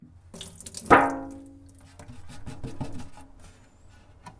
Sound effects > Other mechanisms, engines, machines
Woodshop Foley-095
tink, foley, shop, bop, boom, tools, sfx, perc, pop, metal, bam, wood, strike, little, bang, rustle, thud, crackle, oneshot, sound, knock, fx, percussion